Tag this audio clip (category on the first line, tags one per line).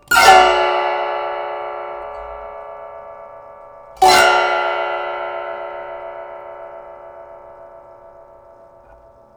Music > Solo instrument
Blue-Snowball
quick
lap-harp
up
gliss
Blue-brand
down